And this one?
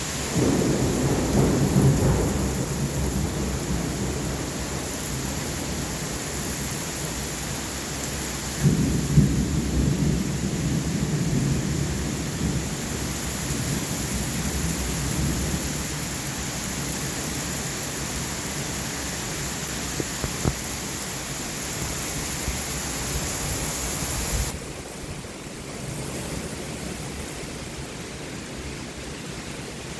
Soundscapes > Nature
STORM-Samsung Galaxy Smartphone, CU Thunderstorm, Severe, Rain, Wind Nicholas Judy TDC
A severe thunderstorm with very heavy rain, booming, rumbling and crashing.
Phone-recording, wind, rain, crash, thunderstorm, rumble, boom, thunder, severe